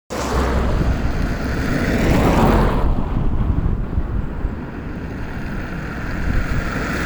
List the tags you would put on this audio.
Sound effects > Vehicles
vehicle
traffic
car

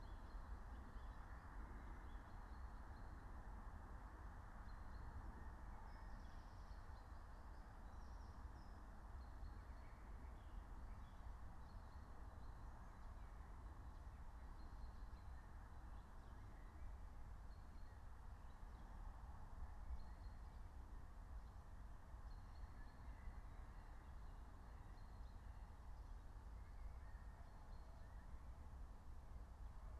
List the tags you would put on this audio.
Soundscapes > Nature
phenological-recording
meadow
soundscape
alice-holt-forest
raspberry-pi
natural-soundscape
nature
field-recording

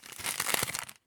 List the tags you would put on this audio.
Sound effects > Objects / House appliances
crinkling
store
handling
receipt

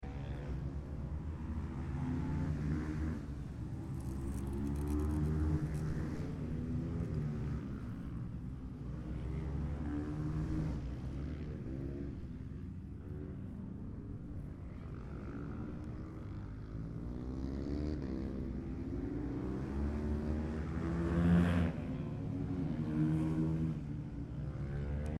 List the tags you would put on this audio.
Soundscapes > Other
bikes
championship
engine
field-recording
motocross
motor
motorbike
motorcycles
noise
race
racetrack
slomczyn
supermoto
tor
warszawa

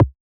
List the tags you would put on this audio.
Instrument samples > Synths / Electronic
electronic
fm
surge
synthetic

